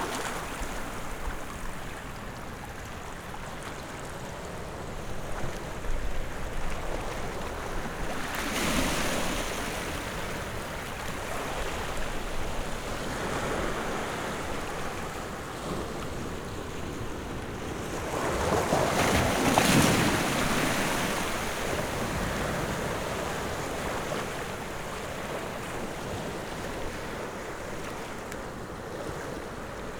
Soundscapes > Nature
AMBIANCE mer cailloux et petit bouillon - sea
Ambiance de la mer proche cailloux, gros plan, petits bouillons - shore, seaside, waves, ocean, stones field-recording, soundscape, couple ORTF
atlantic, coast, field-recording, ocean, proximity, sea, seaside, shore, soundscape, stones, water, waves